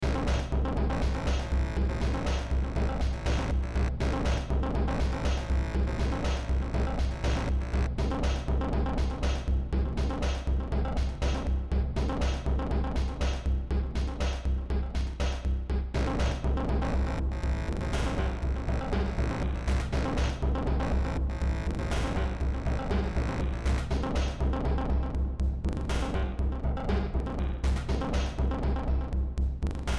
Music > Multiple instruments
Demo Track #3248 (Industraumatic)
Cyberpunk, Games, Industrial, Soundtrack, Sci-fi, Noise, Horror, Ambient, Underground